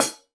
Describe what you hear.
Music > Solo instrument
Vintage Custom 14 inch Hi Hat-004
Oneshot, Drum, Hats, Cymbals, Percussion, Custom, Drums, Vintage, Cymbal, Kit, Metal, Hat, Perc